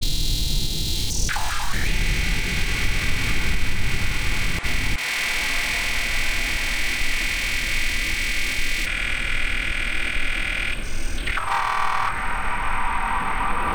Sound effects > Electronic / Design
Trippin in From Da Sky
Abstract, Digital, Droid, Drone, Experimental, FX, Glitch, Neurosis, Otherworldly, Trippin, Trippy